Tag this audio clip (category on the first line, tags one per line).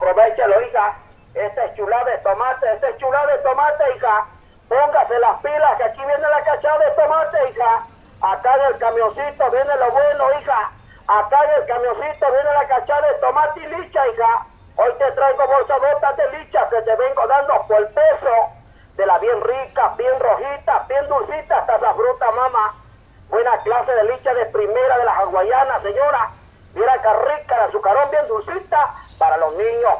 Speech > Solo speech
sonsonate
central
salvador
voice
field
recording
street
el
america
vendor